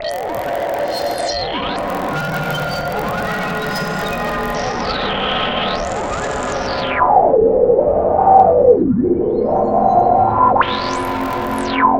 Sound effects > Electronic / Design
Roil Down The Drain 12
content-creator, mystery, noise-ambient, vst, dark-soundscapes, dark-design, scifi, sci-fi, PPG-Wave, drowning, dark-techno, sound-design, horror, cinematic, science-fiction, noise